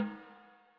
Music > Solo percussion
Snare Processed - Oneshot 122 - 14 by 6.5 inch Brass Ludwig
acoustic, beat, brass, crack, drum, drumkit, drums, flam, fx, hit, hits, kit, ludwig, oneshot, perc, percussion, processed, realdrum, realdrums, reverb, rim, rimshot, rimshots, roll, sfx, snare, snaredrum, snareroll, snares